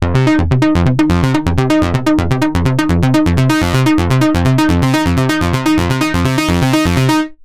Instrument samples > Synths / Electronic
Roland Style Arp
This sound was recreated using the free software synthesizer Vital by Matt Tytel. All sounds sourced from this event are original recordings made by the participants or organizers (no uncleared samples).